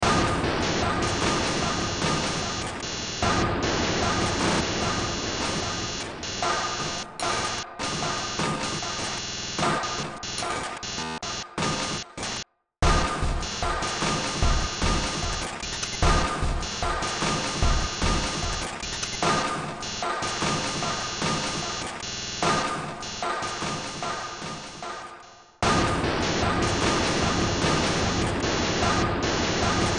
Music > Multiple instruments
Short Track #3149 (Industraumatic)
Cyberpunk, Soundtrack, Horror, Sci-fi, Games, Underground, Noise, Industrial, Ambient